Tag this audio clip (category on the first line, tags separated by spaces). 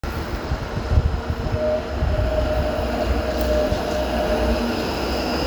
Soundscapes > Urban
city passing tram